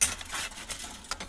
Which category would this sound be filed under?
Sound effects > Other mechanisms, engines, machines